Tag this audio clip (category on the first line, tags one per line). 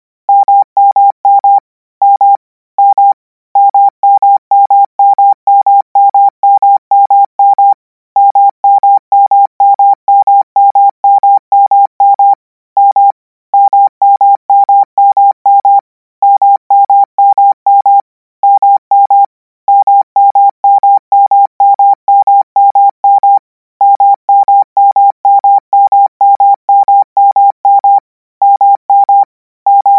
Sound effects > Electronic / Design
code
codigo
letters
morse
radio